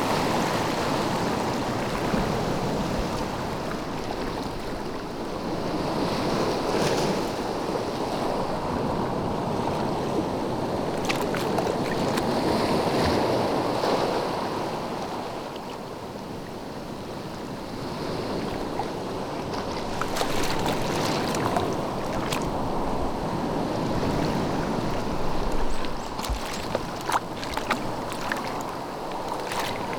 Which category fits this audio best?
Soundscapes > Nature